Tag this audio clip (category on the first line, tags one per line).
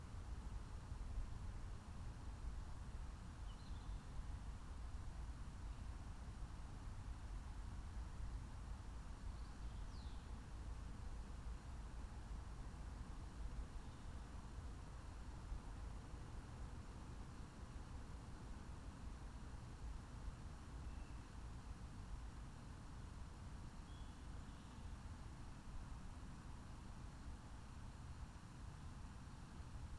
Soundscapes > Nature
field-recording
nature